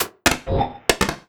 Sound effects > Electronic / Design
SFX MagicReload-01
Whatever bullet you loaded sounds like it's got some extra spice to it. Magic, perhaps? Variation 1 of 4.